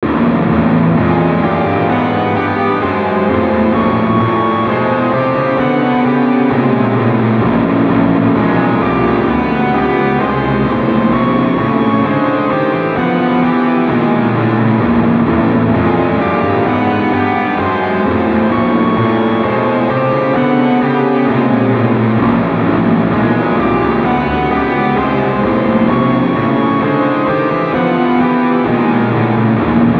Music > Solo instrument
atmo semihorror 13 sem 11 sem 130 BPM opus 1
It's an atmosphere created with the KONTAKT Shreddage 3 Jupiter VST3 guitar. I used the semitonal intervals 0-13/ 0-11/ 0-1/ 0-6. • semitonal interval 0-13: augmented octave • semitonal interval 0-11: major seventh M7 = diminished octave d8 • semitonal interval 0-1: minor second m2 = augmented unison A1 = semitone, half tone, half step S • [used less] semitonal interval 0-6: diminished fifth d5 = augmented fourth A4 = tritone TT • [used way less] semitonal interval 0-3: minor third m3 = augmented second A2 = trisemitone TS It's a loop. To make it interesting cause a 250 ㎳ right channel delay (left channel lead) by introducing silence at the beginning of the right channel.